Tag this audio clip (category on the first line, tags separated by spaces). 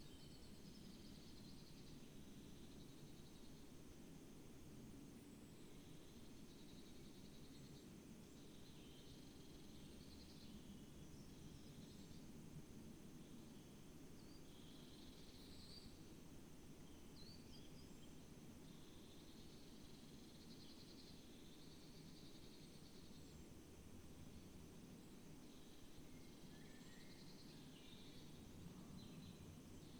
Soundscapes > Nature
Dendrophone soundscape natural-soundscape nature weather-data field-recording artistic-intervention sound-installation raspberry-pi data-to-sound modified-soundscape phenological-recording alice-holt-forest